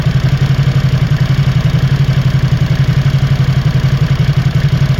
Sound effects > Other mechanisms, engines, machines
puhelin clip prätkä (12)
Description (Motorcycle) "Motorcycle Idling: distinctive clicking of desmodromic valves, moving pistons, rhythmic thumping exhaust. High-detail engine textures recorded from close proximity. Captured with a OnePlus Nord 3 in Klaukkala. The motorcycle recorded was a Ducati Supersport 2019."
Ducati, Motorcycle, Supersport